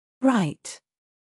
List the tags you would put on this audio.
Speech > Solo speech
english voice pronunciation

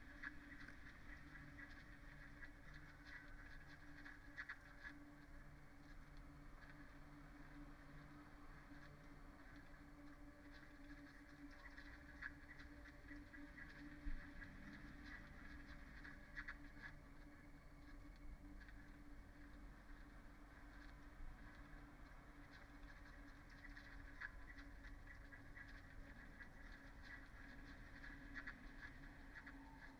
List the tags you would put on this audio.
Soundscapes > Nature
field-recording Dendrophone artistic-intervention modified-soundscape data-to-sound weather-data soundscape raspberry-pi natural-soundscape nature sound-installation alice-holt-forest phenological-recording

